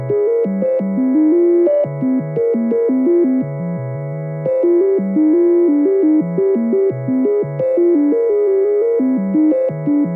Soundscapes > Synthetic / Artificial
Extreme High PAR
Sonification of extreme photosynthetically active radiation (PAR), with bright, fast rhythmic patterns evoking intense sunlight.
PureData, SensingtheForest, Sonification, Climate